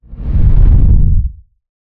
Sound effects > Electronic / Design
bass rumble - deepwhoosh 1
I compressed and restored it using the namesake effects (built-in plug-ins) on WaveLab 11. _____________ WaveLab is a digital audio editor and recording computer software application for Windows and macOS, created by Steinberg (I also use many other companies' editors like Adobe Audition).